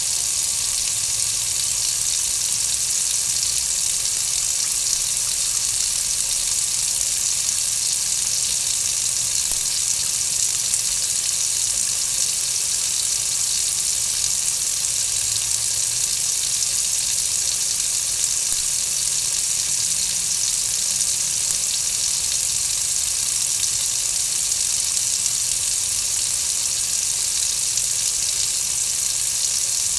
Sound effects > Objects / House appliances
Kitchen Sink 03
To record this sound effect I placed a Rode M5 microphone (attached to a Zoom H4n multitrack recorder) in front of a kitchen sink, and let the water run for roughly two minutes. I then took the raw sound file and used Audacity to prepare the audio for uploading and sharing.
audacity, flow, rode-m5, running, splash, trickle, water, zoom-h4n